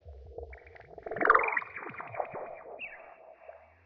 Soundscapes > Synthetic / Artificial
LFO Birsdsong 76
Birsdsong, LFO, massive